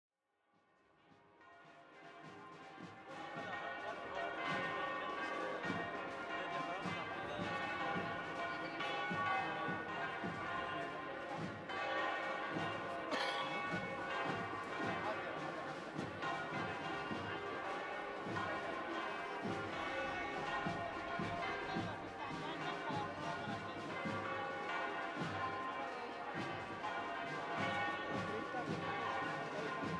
Soundscapes > Urban
San Isidro Catholic procession - Procesión de San Isidro
Ambiente de la procesión de San Isidro en Madrid el día 15 de mayo de 2025. Campanas de una iglesia, procesión en la que suenan varias bandas de tambores y metales correspondientes a los distintos pasos que componen la procesión. Personas que hablan y comentan, en su mayor parte ininteligibles. Ambiente festivo. Atmosphere of the San Isidro procession in Madrid on May 15, 2025. Church bells ringing, a procession featuring several bands of drums and brass instruments accompanying the different religious floats. People talking and commenting, mostly unintelligible. Festive ambiance.